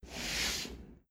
Sound effects > Human sounds and actions
Sliding on floor.
foley, slide, Phone-recording, floor